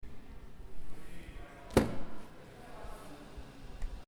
Sound effects > Other mechanisms, engines, machines
Book demagnetizer
Sound of the book security deactivator machine in the library of the Faculty of Arts and Humanities at the University of Porto. Recorded with a Tascam DR-40X
books, library, security